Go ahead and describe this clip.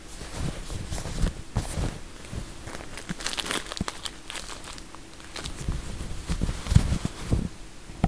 Sound effects > Other
Shirt and bandage
Shirt being lifted. bandage being touched. Shirt being pulled back down.
clothing, bandage, shirt